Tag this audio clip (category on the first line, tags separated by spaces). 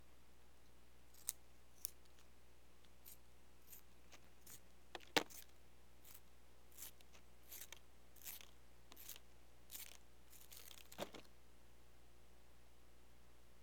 Sound effects > Objects / House appliances
office pencil writing